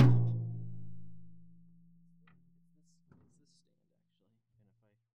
Music > Solo percussion
Med-low Tom - Oneshot 52 12 inch Sonor Force 3007 Maple Rack
acoustic, beat, drum, drumkit, drums, flam, kit, loop, maple, Medium-Tom, med-tom, oneshot, perc, percussion, quality, real, realdrum, recording, roll, Tom, tomdrum, toms, wood